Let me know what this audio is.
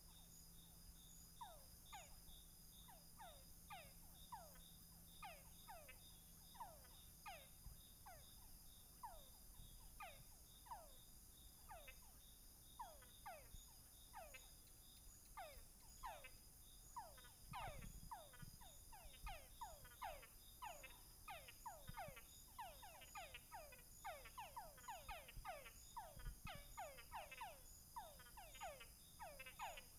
Soundscapes > Nature
Frogs and crikets at night south pacific of Costa Rica
Crickets and Toads in a small lagoon in South Pacific of Costa Rica at night.